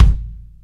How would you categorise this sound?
Instrument samples > Percussion